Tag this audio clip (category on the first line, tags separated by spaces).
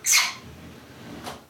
Sound effects > Objects / House appliances
close closing door shower slide sliding